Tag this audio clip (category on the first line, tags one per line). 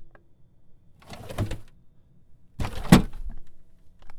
Sound effects > Objects / House appliances
drawer
dresser
open